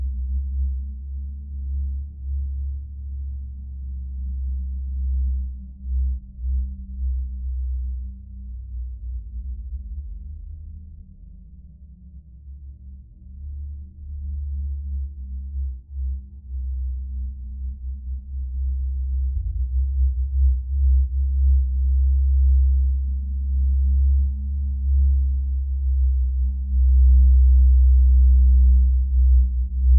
Soundscapes > Synthetic / Artificial
ambient drone landscape texture alien evolving experimental atmosphere dark shimmer shimmering glitchy glitch long low rumble sfx fx bass bassy synthetic effect ambience slow shifting wind howl roar